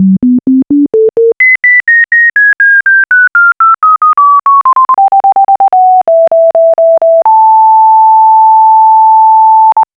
Sound effects > Other mechanisms, engines, machines
robot-speech
10 secs of robot speech
beep, robot, andorid